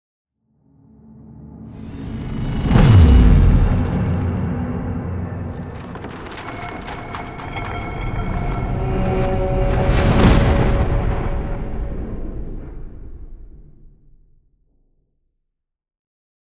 Sound effects > Other
Sound Design Elements SFX PS 079
industrial, tension, boom, video, indent, implosion, sub, explosion, stinger, transition, reveal, movement, whoosh, metal, riser, trailer, cinematic, epic, sweep, game, effect, bass, deep, hit, impact